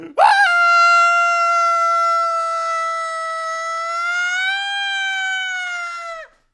Sound effects > Human sounds and actions
cartoonish scream
scream, cartoon, voice